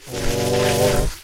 Sound effects > Other
combo fire holy
33 - Combined Fire and Holy Spells Sounds foleyed with a H6 Zoom Recorder, edited in ProTools together
combination, fire, holy, spell